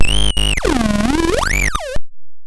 Sound effects > Electronic / Design
Optical Theremin 6 Osc dry-100
Synth, DIY, FX, Scifi, Noise, Glitchy, Robot, Handmadeelectronic, Theremin, noisey, Spacey, Sci-fi, Analog, Bass, Robotic, Infiltrator, Glitch, Digital, Electro, Optical, Instrument, Otherworldly, Dub, Electronic, Experimental, SFX, Sweep, Alien, Theremins, Trippy